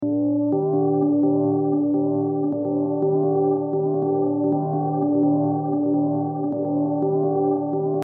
Sound effects > Electronic / Design
Sci-Fi/Horror Alarm 2
Sounds dystopian, like something from Half-Life 2.
sci-fi, warning, space, fx, eerie, horror, effect, alarm, hl2, half-life, alert